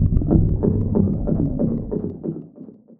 Instrument samples > Synths / Electronic
CVLT BASS 59
bass, bassdrop, clear, drops, lfo, low, lowend, stabs, sub, subbass, subs, subwoofer, synth, synthbass, wavetable, wobble